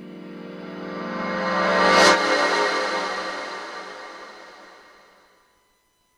Sound effects > Electronic / Design
Magical Sweep
Short clip horror theme
ambient, freaky, horror, pad